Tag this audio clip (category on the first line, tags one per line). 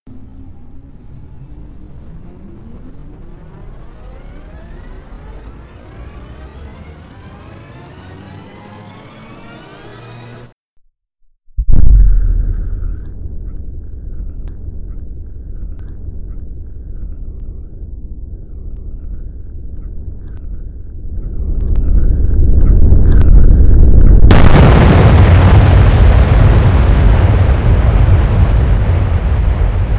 Sound effects > Other mechanisms, engines, machines
explosion
nuke
weapon
space-cannon
shockwave
mass-destruction
orbital-strike